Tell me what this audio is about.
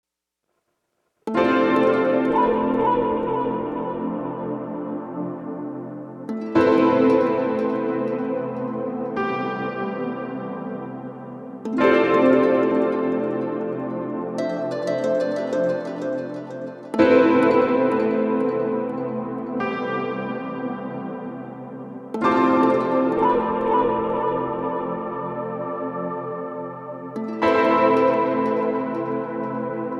Music > Multiple instruments
Ableton Live. VST.Purity......Musical Composition Free Music Slap House Dance EDM Loop Electro Clap Drums Kick Drum Snare Bass Dance Club Psytrance Drumroll Trance Sample .
Bass, Composition, Musical, Dance, Electro, House, Snare, Clap, Drum, Loop, Free, Music, Drums, Slap, Kick, EDM